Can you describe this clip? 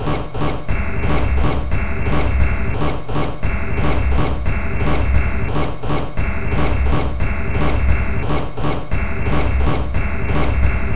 Instrument samples > Percussion
This 175bpm Drum Loop is good for composing Industrial/Electronic/Ambient songs or using as soundtrack to a sci-fi/suspense/horror indie game or short film.
Alien, Loop, Packs, Samples, Dark, Ambient, Industrial, Loopable, Underground, Weird, Soundtrack, Drum